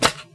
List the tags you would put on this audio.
Sound effects > Other
weapon spike duel arrow sharp needle thrust hit impact strike shovel stick impale pierce

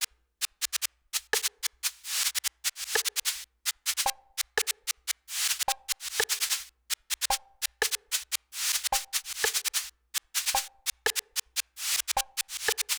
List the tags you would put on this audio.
Music > Solo instrument
TECH DUB RIM

